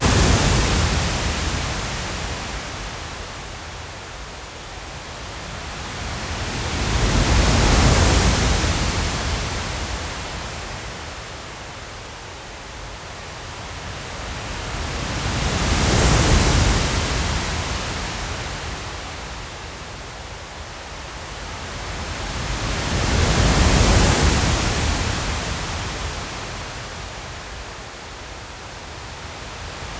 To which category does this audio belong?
Sound effects > Electronic / Design